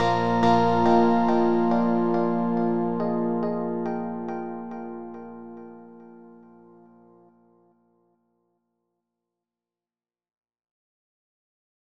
Music > Solo instrument
1990s Operating System Start Sound - Optimistic
A startup sound for a 1990s operating system, loosely around 140bpm. Made in FL Studio using only stock FL Keys, reverb, delay, and EQ. This one has more "optimistic" top notes.
boot, operating, sound, xp